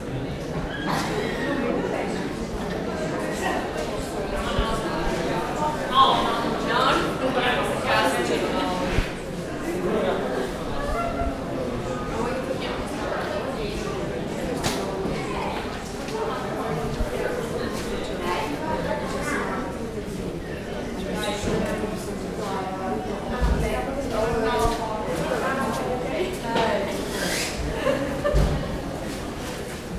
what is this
Soundscapes > Indoors
People, Voices, Laughs - Biennale Exhibition Venice 2025
People chatting and laughing. You can hear voices of them like in a crowd Sound recorded while visiting Biennale Exhibition in Venice in 2025 Audio Recorder: Zoom H1essential
people, biennale, chatting, crowd, museum, field-recording, talking, voices, exhibition, venice